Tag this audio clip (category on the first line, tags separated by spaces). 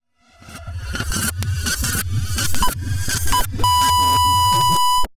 Sound effects > Electronic / Design
Electronic Synth Spacey SFX Dub